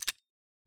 Sound effects > Other mechanisms, engines, machines
Circuit breaker shaker-004
percusive, recording, sampling, shaker